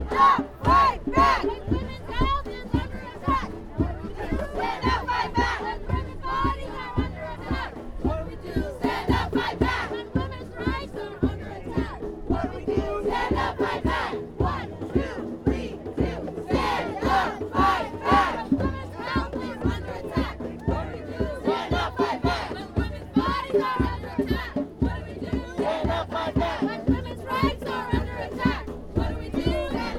Speech > Conversation / Crowd
Protest Chants - Women's March 2018 in NYC
Recorded on a Zoom H1 at the 2018 Women's March held in New York City, NY, USA
chants, crowd, demonstration, march, people, protest, voice